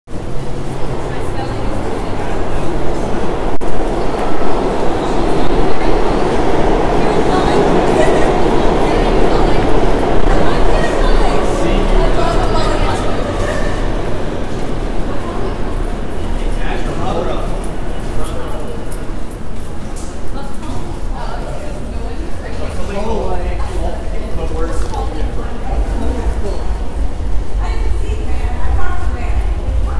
Soundscapes > Urban
Out of Subway, into edge of Times Square
Leaving the subway and exiting the subway station at the edge of Times Square in New York.